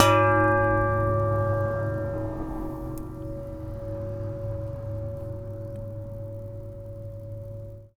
Sound effects > Objects / House appliances
Junkyard Foley and FX Percs (Metal, Clanks, Scrapes, Bangs, Scrap, and Machines) 150
Metallic, SFX, dumping, scrape, Robotic, Metal, Ambience, trash, rattle, tube, Bang, Junkyard, Perc, Percussion, Environment, Junk, dumpster, Foley, garbage, Smash, FX, Dump, Bash, Clank, Atmosphere, waste, Machine, Clang, Robot, rubbish